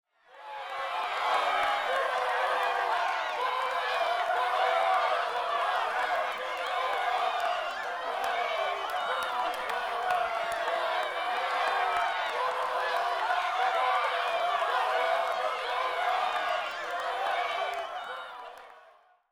Speech > Conversation / Crowd
Group of people (bit more than 20) yelling and screaming at an fight (acting, of course). Gear: Zoom H4n Built in XY Mics